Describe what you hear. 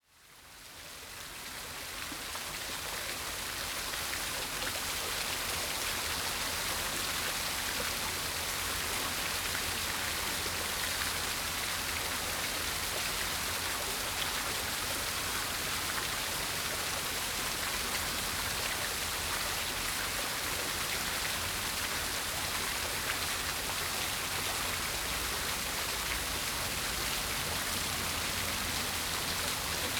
Soundscapes > Urban
A recording of water passing through a canal lock to fill up again.